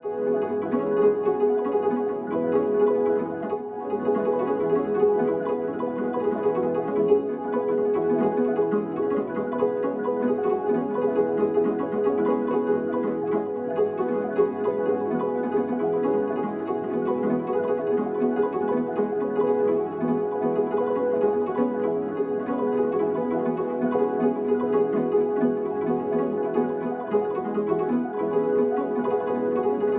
Soundscapes > Synthetic / Artificial
Botanica-Granular Ambient 8
Atomosphere, Botanica